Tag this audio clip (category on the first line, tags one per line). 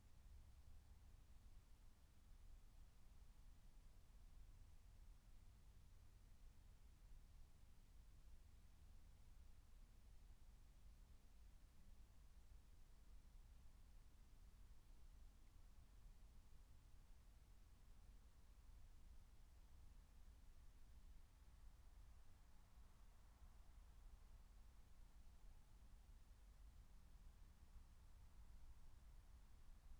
Soundscapes > Nature
field-recording
phenological-recording
meadow
raspberry-pi
soundscape
natural-soundscape
nature
alice-holt-forest